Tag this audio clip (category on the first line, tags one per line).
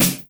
Instrument samples > Percussion
wires
hit
drum
snare
layering
drums